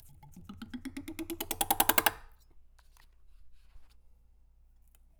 Sound effects > Objects / House appliances
Metal Beam Knife Plank Vibration Wobble SFX 9
ting, FX, SFX, metallic, Beam, Clang, Trippy, Foley, Wobble, Vibrate, Klang, Metal, Vibration, ding, Perc